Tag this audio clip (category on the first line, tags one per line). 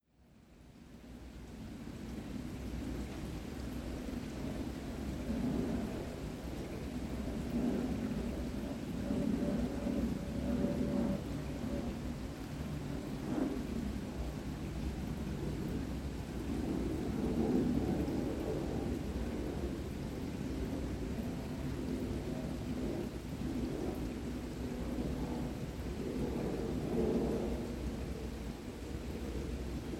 Sound effects > Vehicles
Phone-recording
airplane
rain
overhead
by
pass
distant
jet